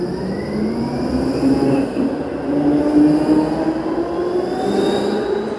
Soundscapes > Urban
voice 7 17-11-2025 tram
TramInTampere, Rattikka